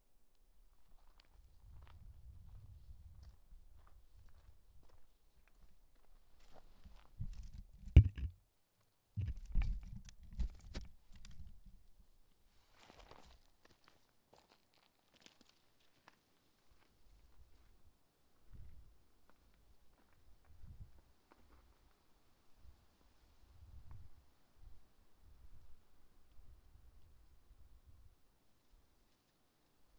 Soundscapes > Nature
forest tone
The forest outside Terrassa, Spain on a Friday night in November. It was pretty chilly, and spooky. Used in my short film, Draculas: The Movie.
ambience, field-recording, forest, nature, night, rustling, wind